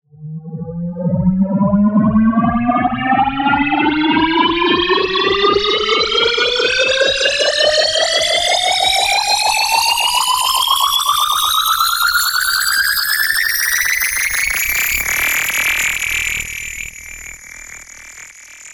Sound effects > Electronic / Design
Alien Riser/Powerup
Made in LMMS using 3xOsc and a sh** ton of effects.
alien, ambient, horror, machine, riser, sci-fi, strange, uplift